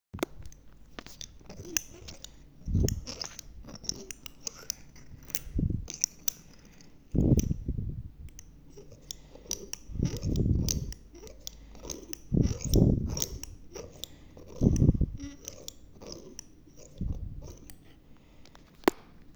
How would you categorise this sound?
Sound effects > Human sounds and actions